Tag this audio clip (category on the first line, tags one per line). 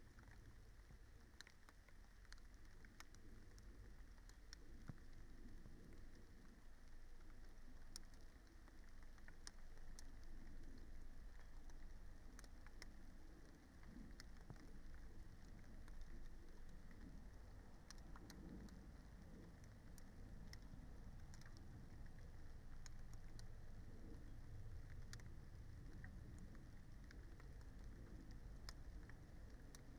Soundscapes > Nature
phenological-recording
nature
soundscape
raspberry-pi
natural-soundscape
meadow
field-recording
alice-holt-forest